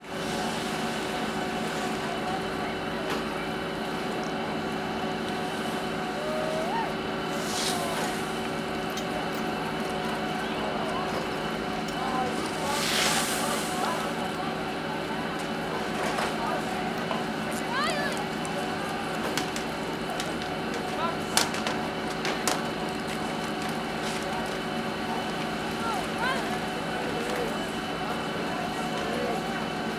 Soundscapes > Urban
Chair lift at base of Mount Sima
The lift station at the base of the Mount Sima Ski hill in Whitehorse, Yukon. Contains voices, swishing of skis on snow, the high-speed quad at variable speeds. Recorded on a Zoom H2n in 150-degree stereo mode.
field-recording, high-speed-quad, motor, whitehorse, yukon